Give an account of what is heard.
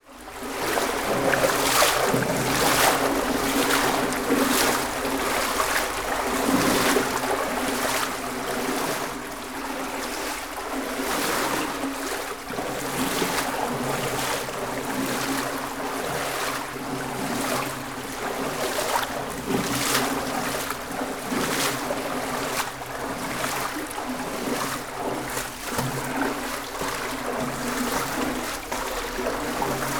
Soundscapes > Nature
A recording of me wading through water in a small tunnel.
ambience, field, flowing, Re, recording, wading, water